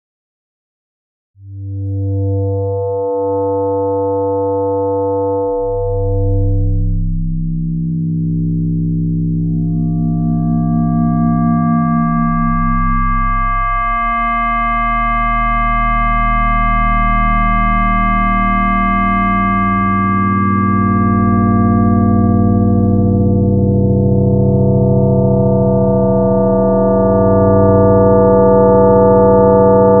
Soundscapes > Synthetic / Artificial
Another experiment with modulations leading to dark vibrating sound
ambient, atmosphere, crrepy, dark, deep, drone, pad, suspence